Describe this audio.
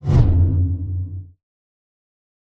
Sound effects > Other
Sound Design Elements Whoosh SFX 027
audio, effect, element, elements, film, motion, movement, sweeping, swoosh, transition, whoosh